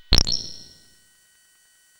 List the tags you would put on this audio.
Instrument samples > Synths / Electronic
BENJOLIN
CHIRP
DRUM
MODULAR
NOISE
SYNTH

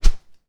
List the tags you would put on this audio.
Sound effects > Objects / House appliances
coat-hanger
Fast
FR-AV2
Hanger
NT5
Plastic
Rode
SFX
swing
swinging
Tascam
Transition
Whoosh

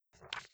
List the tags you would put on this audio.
Sound effects > Objects / House appliances
book
flick
reading
read
page
flip
turning
paper
turn

